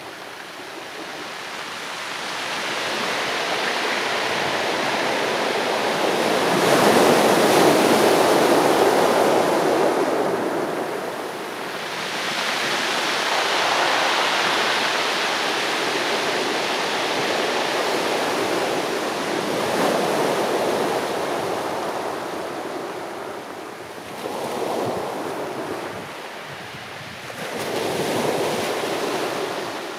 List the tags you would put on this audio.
Soundscapes > Nature
beach water shore waves coast ocean surf field-recording seaside sea shingle wave

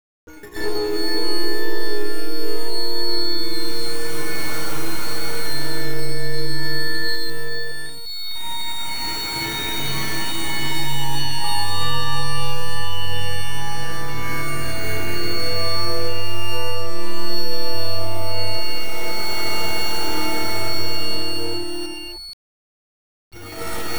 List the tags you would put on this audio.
Soundscapes > Synthetic / Artificial
electronic experimental free glitch granulator noise packs sample samples sfx sound-effects soundscapes

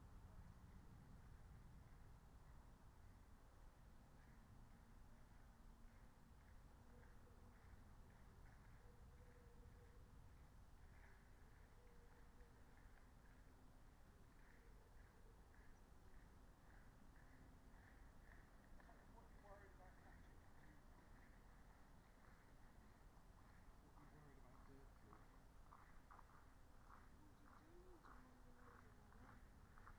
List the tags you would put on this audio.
Soundscapes > Nature
phenological-recording natural-soundscape alice-holt-forest nature raspberry-pi field-recording meadow soundscape

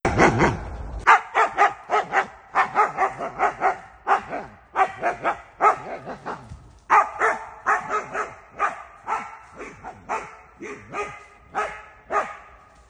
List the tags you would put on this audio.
Sound effects > Animals
bark barking canine complain complaining dog doggie fuss fussing groan groaning grumble grumbling malinois moan moaning pet yelp yelping

